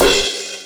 Instrument samples > Percussion
crash Zildjian 16 inches bassized semibrief 2
I have it in different durations. The "2" files have a warmer attack. tags: Avedis bang China clang clash crack crash crunch cymbal Istanbul low-pitched Meinl metal metallic multi-China multicrash Paiste polycrash Sabian shimmer sinocrash Sinocrash sinocymbal Sinocymbal smash Soultone spock Stagg Zildjian Zultan